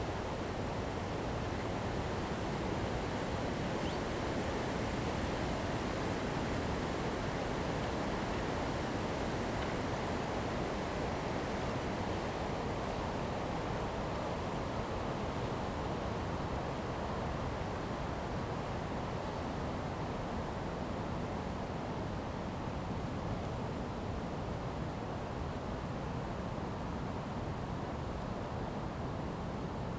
Soundscapes > Urban
Windy City Park at Night
Late night in lightly snowy park. 2ch surround. #0:04 tree squeaking #0:09 wood clanking #0:15 wind clipping #0:30 something metal squeaking, probably a sign or maybe a gate, going on up until #1:04 and again around #1:20 #0:35 something driving past in the distance, perhaps a train? #1:04 more wind, more mic clipping or moving something very close to the mic #1:28 something clicking, perhaps melting snow landing near mic? #1:44 plane? #1:49 far s-bahn train departing?